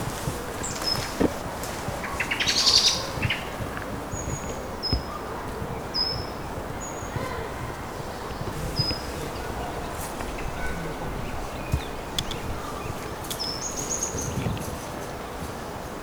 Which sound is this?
Soundscapes > Nature
20250312 Collserola Bird
Bird, Collserola, Nature